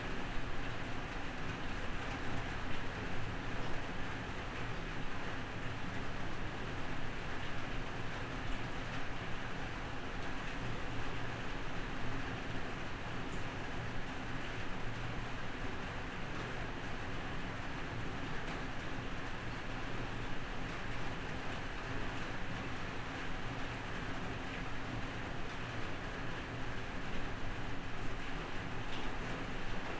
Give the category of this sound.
Sound effects > Natural elements and explosions